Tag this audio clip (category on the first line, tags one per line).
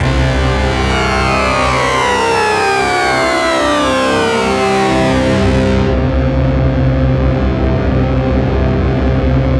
Music > Solo instrument
Rare
Retro
SynthLoop
MusicLoop
80s
Analogue
Vintage
Music
SynthPad
Texture
Loop
Synth
Analog